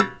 Sound effects > Other mechanisms, engines, machines
Handsaw Oneshot Metal Foley 16
handsaw, perc, percussion, saw, shop, tool, twangy